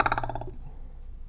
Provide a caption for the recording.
Sound effects > Objects / House appliances
knife and metal beam vibrations clicks dings and sfx-112
ting, Wobble, Foley, Perc, Clang, Beam, Vibrate, Trippy, Metal, Vibration, metallic, SFX, FX, Klang, ding